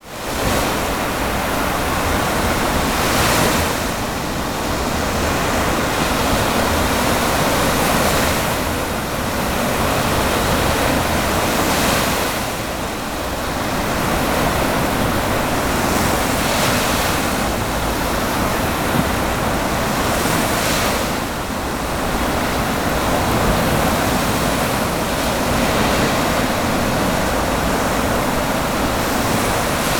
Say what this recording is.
Soundscapes > Nature
Heavy seawaves - Side - 14 06 2015 - 21h21
Lightly edited. Enjoy! And a happy new year.
Field-Recording, Sea, Side